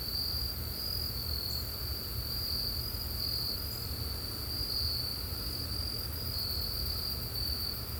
Soundscapes > Urban
Jackwald's Sounds (Series I) - Soundscapes, Urban, Evening Cricket Ambience (Looped) General evening urban cricket ambience, edited to loop. This sound is useful as background noise for nighttime scenes. This was recorded while I was out for a walk around 07:00 PM near the cabins at Camp Twin Lakes Rutledge, GA on October 17th, 2025.